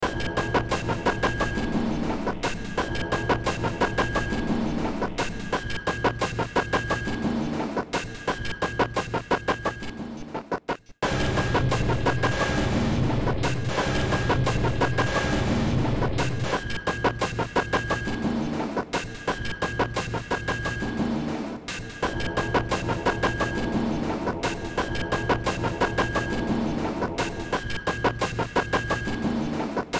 Music > Multiple instruments
Short Track #3944 (Industraumatic)
Ambient, Cyberpunk, Games, Horror, Industrial, Noise, Sci-fi, Soundtrack, Underground